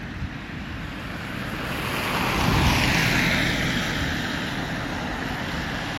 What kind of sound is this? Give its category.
Soundscapes > Urban